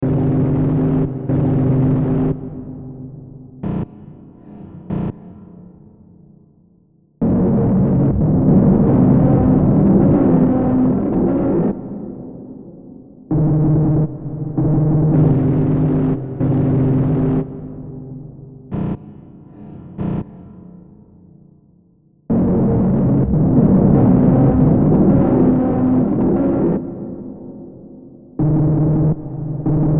Soundscapes > Synthetic / Artificial
Looppelganger #204 | Dark Ambient Sound
Use this as background to some creepy or horror content.
Ambience, Ambient, Darkness, Drone, Games, Gothic, Hill, Horror, Noise, Sci-fi, Silent, Soundtrack, Survival, Underground, Weird